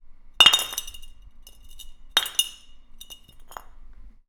Sound effects > Objects / House appliances
Glass bottle dropped on concrete floor
A glass wine bottle being gently dropped on a concrete floor (in the recycling room). Recorded with a Zoom H1.
Crash, Glass, Bottle, Concrete, Floor, Impact, Dropping, Drop